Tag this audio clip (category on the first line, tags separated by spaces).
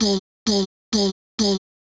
Speech > Solo speech
BrazilFunk FX One-shot Vocal